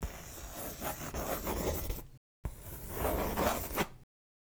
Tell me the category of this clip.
Sound effects > Objects / House appliances